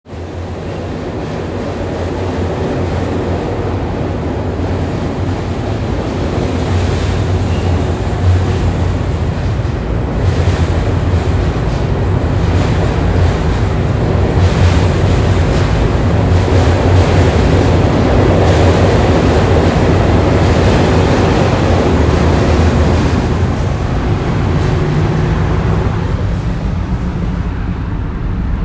Sound effects > Vehicles
Train passing Over Bridge 2
Train passes overhead. Recorded from under a concrete bridge.
bridge, locomotive, rail, railroad, railway, train